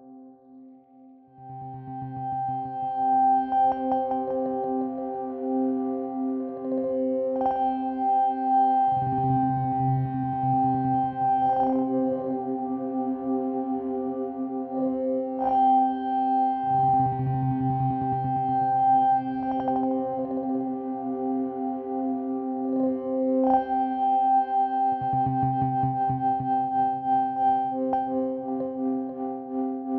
Music > Other
Brilliant granular blips in the night sky
spacecraft, atmosphere